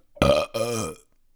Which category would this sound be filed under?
Sound effects > Human sounds and actions